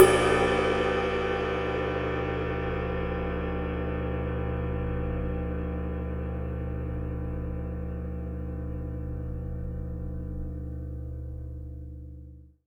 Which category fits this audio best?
Music > Solo instrument